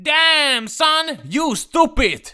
Speech > Solo speech
damn son you stupid
man, suprised, voice, male